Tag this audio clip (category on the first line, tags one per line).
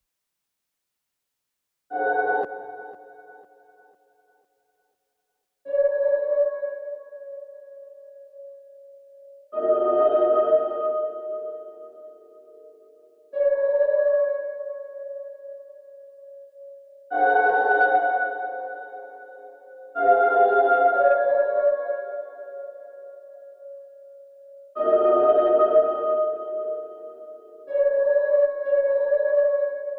Music > Solo instrument
analog
eerie
horror
synth